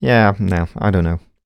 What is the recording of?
Speech > Solo speech
Doubt - Yeah nah I dunno
dont, skeptic, voice, U67, skepticism, Male, know, dialogue, Neumann